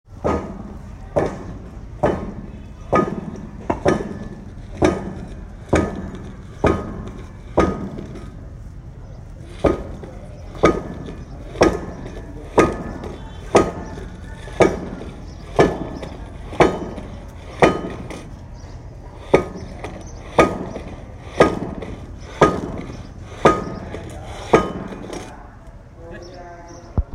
Sound effects > Other mechanisms, engines, machines
Gỡ Ống Bơm Cát - Sand Pipe
Hit sand pipe. Record use iPhone 7 Plus smart phone. 2025.05.11 07:07
pipe, percussion, hit, metal